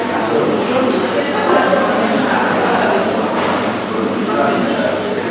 Sound effects > Human sounds and actions
Ambient shopping mall sounds. This sound was recorded by me using a Zoom H1 portable voice recorder.
buzz, mall, noise, sfx, shop, shoppin, supermarket